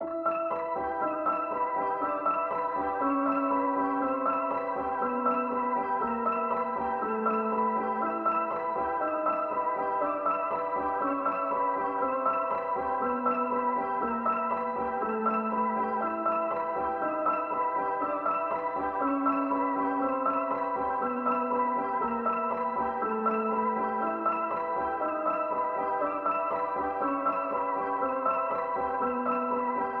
Music > Solo instrument
Piano loops 188 efect 2 octave long loop 120 bpm
Beautiful piano harmony inspired by the work of Danny Elfman. This sound can be combined with other sounds in the pack. Otherwise, it is well usable up to 4/4 120 bpm.
120, 120bpm, free, loop, music, piano, pianomusic, reverb, samples, simple, simplesamples